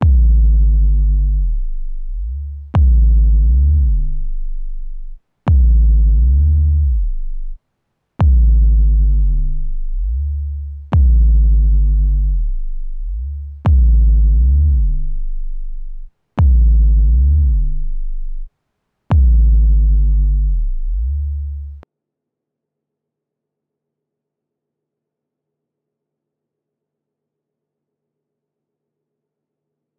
Instrument samples > Synths / Electronic
kick 3 loop - segment in G Major
A loop I made with the Kick 3 drum synth and a little portimento, put through some distortion and other effects
synth, drum